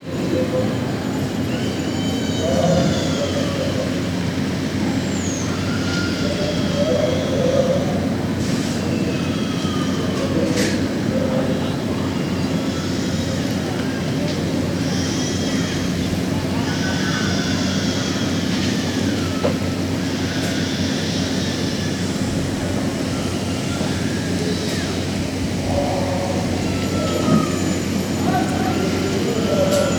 Soundscapes > Urban
Loud India (Baby don't cry)
bells, Hindu, Hinduism, Temple, Yoga